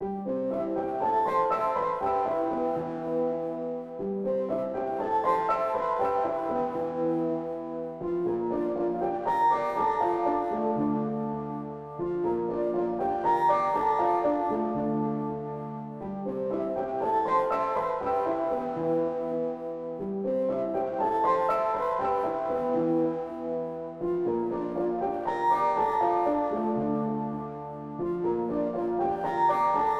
Music > Solo instrument
Piano loops 195 efect 3 octave long loop 120 bpm
120, 120bpm, free, loop, music, piano, pianomusic, reverb, samples, simple, simplesamples